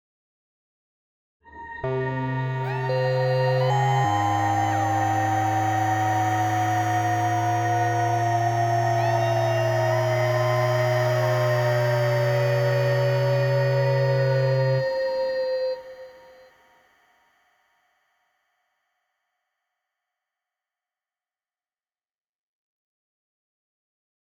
Sound effects > Electronic / Design
UFO abduction sound effect 1
UFO sound effect created using synth patches on Xpand
alien, space, spooky